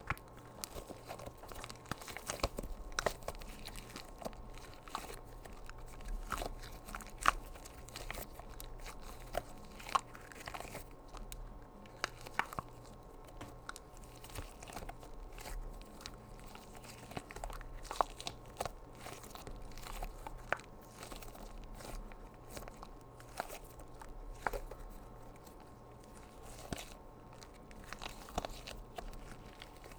Sound effects > Natural elements and explosions
A taco squishie. Also useful for Liquid & Mud.